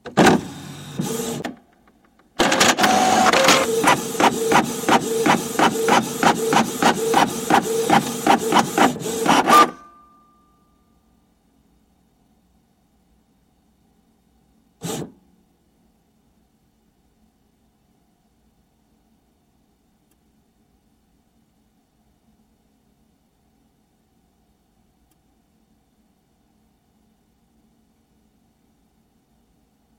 Sound effects > Objects / House appliances
canon pixma print regular
Recording of a Canon Pixma TS3720 all-in-one printer. To get this recording, the microphones were placed inside the edge of the printer. Then we set the printer off. Do not attempt this yourself without an expert assisting you, as I had a printers expert with me when setting this up.
work, ASMR, nostalgia